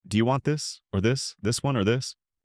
Speech > Solo speech
Trial - Sound
Just a trial sound for this platform.
demo, field, recording